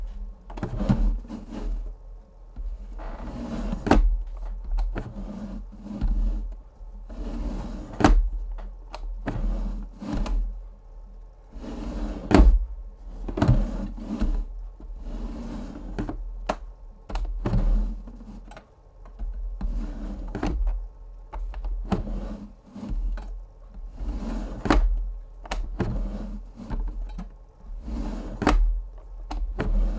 Sound effects > Objects / House appliances
A cooler opening and closing.